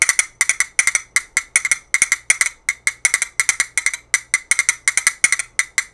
Music > Solo percussion
MUSCPerc-Blue Snowball Microphone, CU Castanets, Spanish Rhythm Nicholas Judy TDC
A spanish castanet rhythm.
Blue-brand, rhythm, spanish